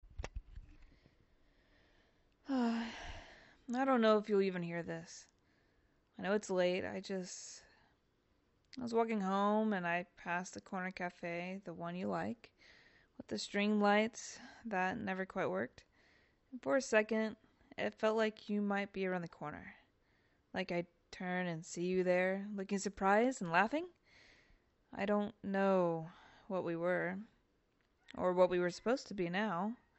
Speech > Solo speech
“If You’re Still Listening” (romantic / vulnerable / soft love confession)
A quiet, emotional love confession delivered like a late-night voice message. Ideal for romantic performances, soft narration, or poetic audio storytelling. Script: Hey. I don’t know if you’ll even hear this. I know it’s late. I just… I was walking home, and I passed that corner café—the one you liked, with the string lights that never quite worked. And for a second, it felt like you might be around the corner. Like I’d turn and see you there, looking surprised. Laughing. I don’t know what we were, or what we’re supposed to be now. But I miss you. Not in that desperate, please-come-back way. Just… I miss the way you saw the world. I miss how quiet felt full with you around. I don’t need you to say anything. I’m not expecting anything. But if you’re still listening… Just know: You mattered. You still do.